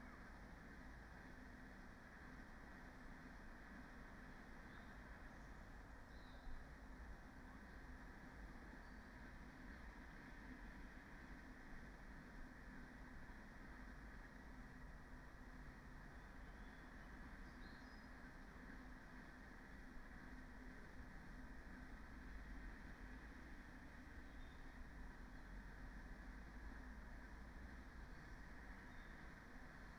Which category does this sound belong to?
Soundscapes > Nature